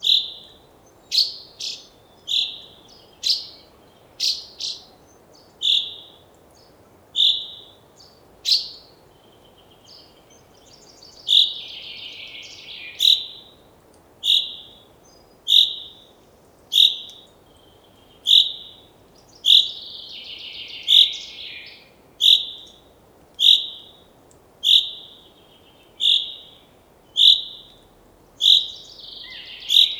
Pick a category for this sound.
Sound effects > Animals